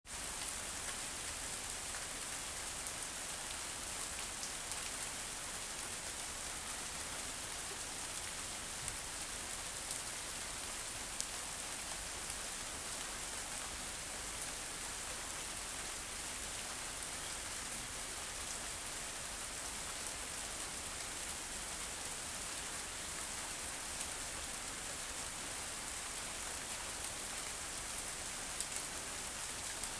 Sound effects > Natural elements and explosions
020525 2240 ermesinde chuva telefone 02
Friday, May 2nd Around 10:40 pm In Ermesinde Rain (fx-n) Cell phone microphone Recorded outdoors, close to the sound source 020525_2240_ermesinde_rain_telephone_02
field-recording, nature, rain